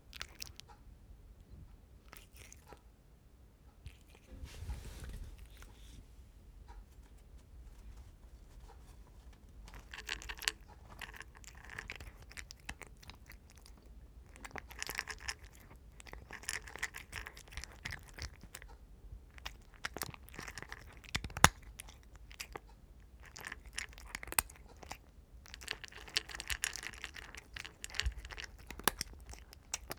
Sound effects > Animals
Cat eating dry-food (croquettes)
Subject : A elderly female Cat called "minette" eating dry catfood. Date YMD : 2025 04 22 Location : Gergueil France Hardware : Tascam FR-AV2 Rode NT5 handheld. Weather : Processing : Trimmed and Normalized in Audacity. a HPF might have been applied.
Cat, croquettes, dryfood, eating, elderly, female, food, FR-AV2, NT5, Rode, Tasam